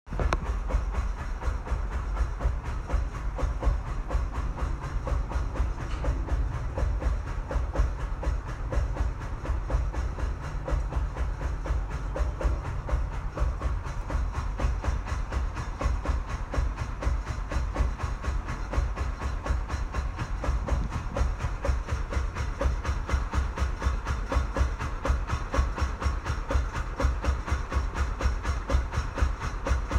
Sound effects > Other mechanisms, engines, machines
Động Cơ 7
Engine for big wood saw. Record use iPhone 7 Plus 2025.05.28 15:30
engine, gasoline, motor, piston